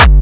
Instrument samples > Percussion
OldFiles-Classic Crispy Kick 1-G
Synthed with phaseplant only, just layered 2 noise generator and a 808 kick that synthed with sine wave, then overdrived them all in a same lane. Processed with Khs Distrotion, Khs Filter, Khs Cliper. Final Processed with ZL EQ, OTT, Waveshaper.
Distorted, Kick